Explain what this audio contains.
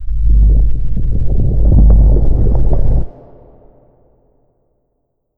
Sound effects > Natural elements and explosions
LoFi RocksGrinding Reverberated-02
Lo-Fi sound of rocks moving and scraping against each other. Stops abruptly, but reverberates. Foley emulation using wavetable synthesis.
cave, quake, crunching, scraping, wavetable, synthesizer, synthesis, grinding, rocks, lofi, stone, moving, earthquake